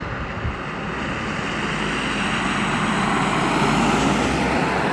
Soundscapes > Urban
Car passing by 7
car, tyres, driving, city